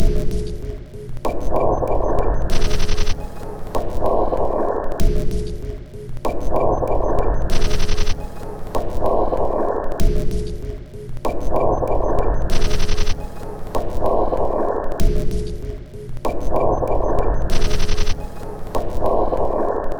Instrument samples > Percussion
This 96bpm Drum Loop is good for composing Industrial/Electronic/Ambient songs or using as soundtrack to a sci-fi/suspense/horror indie game or short film.
Ambient, Industrial, Underground, Loopable, Samples, Packs, Soundtrack, Alien, Drum, Dark, Loop, Weird